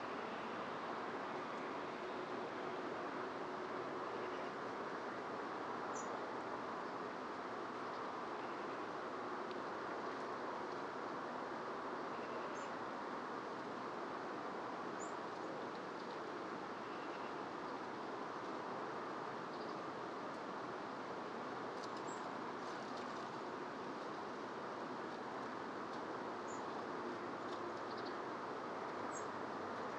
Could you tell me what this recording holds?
Soundscapes > Nature
Autumn field recording in a location where two different cultures merge. The sounds of resident birdsong, the sound of falling leaves, the occasional dog barking, and the occasional car passing by. Tascam DR05